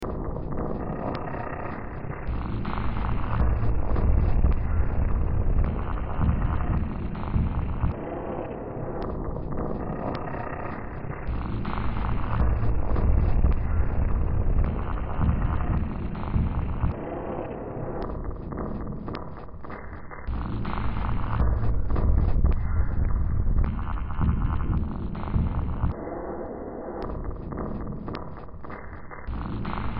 Music > Multiple instruments
Demo Track #3488 (Industraumatic)
Ambient, Cyberpunk, Games, Horror, Industrial, Noise, Sci-fi, Soundtrack, Underground